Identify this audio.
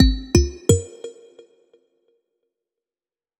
Sound effects > Electronic / Design
An achievement sound, but in crystal edition